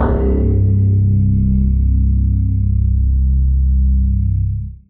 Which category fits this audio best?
Instrument samples > Synths / Electronic